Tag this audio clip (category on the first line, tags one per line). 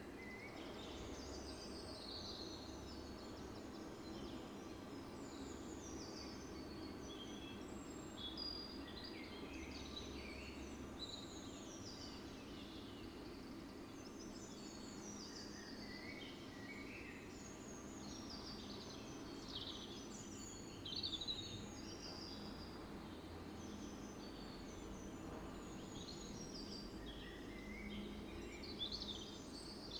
Soundscapes > Nature
phenological-recording
weather-data
field-recording
raspberry-pi
natural-soundscape
data-to-sound
alice-holt-forest